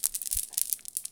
Sound effects > Objects / House appliances
Crossing bead curtain 2(simulated)
A simulated sound of someone crossing a bead curtain, done by multiplying the base audio recording of "Beads" uploaded on the same account.
bead, curtain, door